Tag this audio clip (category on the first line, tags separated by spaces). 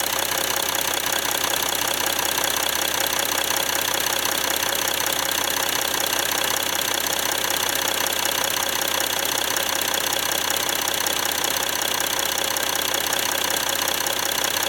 Sound effects > Vehicles
car diesel engine idle motor v6